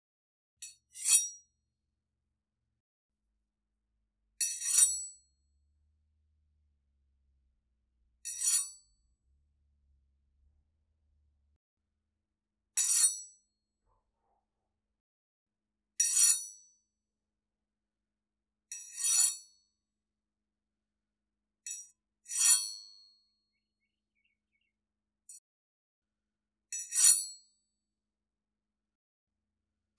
Sound effects > Objects / House appliances
tmnt 2012 inspired silverware knives leo and raph sword sai like scrapes and hits 04102025
recordings of silverware knives sliding against each other. I decreased the speed of the original to make the sounds that fits the size of the blades. note: few sounds invlove hitting and ratting sound of that of sais inspired by tmnt 2012.